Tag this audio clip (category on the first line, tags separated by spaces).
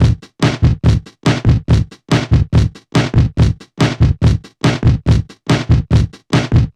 Instrument samples > Percussion
drumbeat drumloop